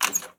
Sound effects > Other mechanisms, engines, machines
Releasing the engine stop lever on a push mower. Recorded with my phone.
button, click, latch, lever, press, squeak, squeek